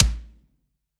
Instrument samples > Percussion
What's Kickin'?
Custom kick one shot. Enough smack to cut through a mix and still retain the fundamental sub response.
bass-drum, drum, drums, hit, kick, one-shot, percussion, sample